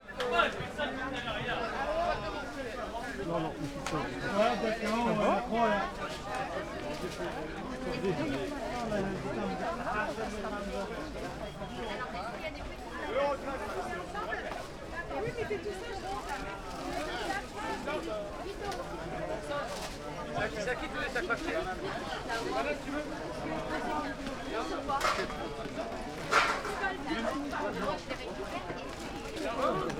Soundscapes > Urban
250501 103732 FR Downtown market
Downtown market. (take 1) Here we are in an outdoor market located in Nanterre downtown (suburbs of Paris, France). One can hear the atmosphere of the market, with vendors promoting and selling their products (they’re mostly talking in French, but also in their languages as some of them are from North-African countries), while customers are chatting and buying. In the background, usual noise from market, and almost no noise from the surrounding streets, as the recording has been made during Labour Day. Recorded in May 2025 with a Zoom H5studio (built-in XY microphones). Fade in/out applied in Audacity.